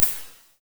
Instrument samples > Synths / Electronic
IR (Analog Device) - Late 90s Soundcraft Signature 12 - ROOM MOD
Analog, IR, Reverb